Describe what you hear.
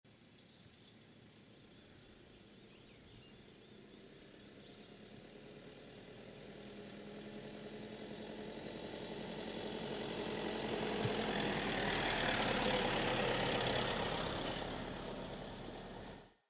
Sound effects > Vehicles
Toyota land cruiser drive past
drive-past, 4x4, land-cruiser